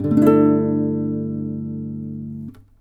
Music > Solo instrument
acoustic guitar pretty chord 6
acosutic, chord, chords, dissonant, guitar, instrument, knock, pretty, riff, slap, solo, string, strings, twang